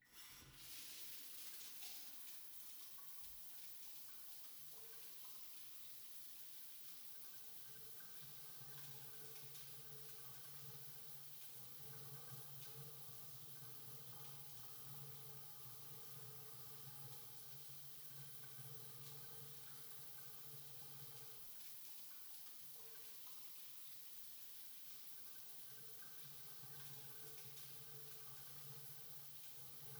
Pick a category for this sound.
Sound effects > Objects / House appliances